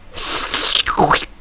Sound effects > Human sounds and actions
It's just me recording my own licking sound. Thanks!
Suck, Licks